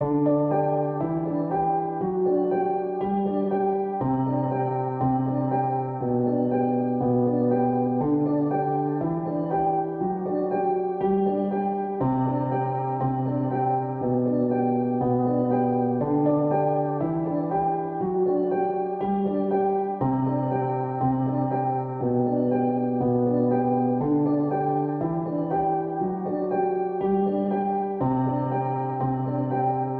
Music > Solo instrument
Piano loops 055 efect 4 octave long loop 120 bpm
samples, loop, reverb, piano, free, simplesamples, 120bpm, pianomusic, 120, simple, music